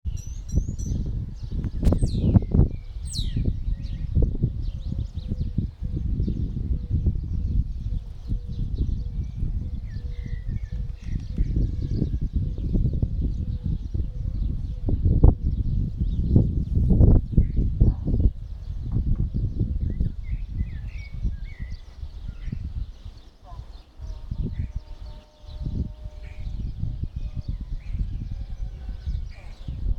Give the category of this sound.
Soundscapes > Nature